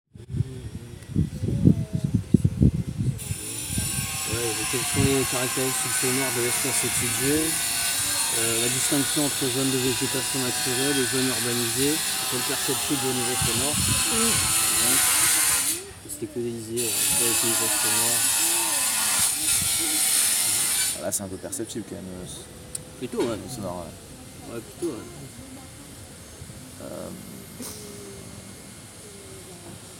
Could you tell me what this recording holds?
Soundscapes > Urban
bruit de bricolage
sound of circular saw and urban building
saw, tool